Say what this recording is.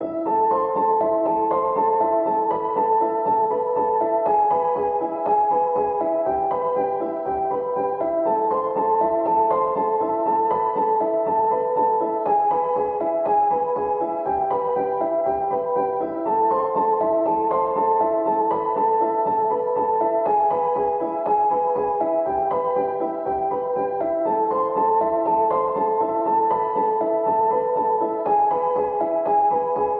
Music > Solo instrument
Piano loops 122 efect 4 octave long loop 120 bpm
music, simplesamples, 120, pianomusic, simple, free, reverb, 120bpm, piano, samples, loop